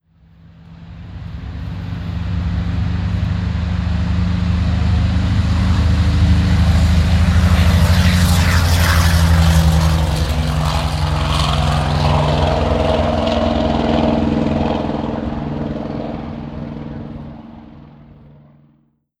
Sound effects > Vehicles
An AD-4 Skyraider passing by. Recorded at the Military Aviation Museum at Virginia Beach in Summer 2021.